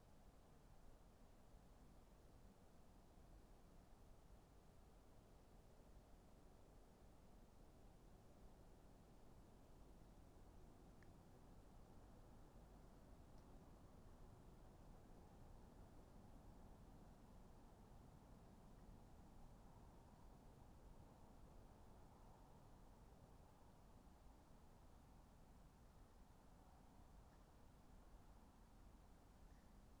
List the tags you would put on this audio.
Soundscapes > Nature
Dendrophone
data-to-sound
soundscape
weather-data
sound-installation
field-recording
modified-soundscape
phenological-recording
alice-holt-forest
natural-soundscape
artistic-intervention
nature
raspberry-pi

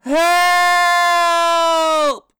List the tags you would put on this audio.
Speech > Solo speech
yell
Blue-Snowball
Blue-brand
help
male

